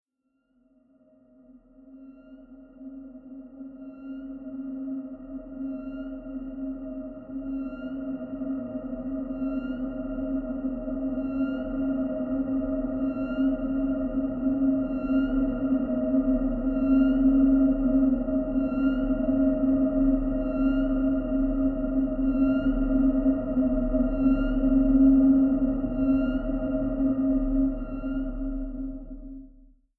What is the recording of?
Soundscapes > Synthetic / Artificial
Pulse of Terror - Horror Atmosphere (Preview)
A dark and eerie horror soundscape driven by haunting pulses and subtle dread. Perfect for building tension in horror films, games, and unsettling scenes.
disturbing, suspense, pulse, atmospheric, ghostly, dark, thriller, creepy, tension, cinematic, soundscape, horror, eerie, ambient, terror, fear, haunting, subtle, nightmare, background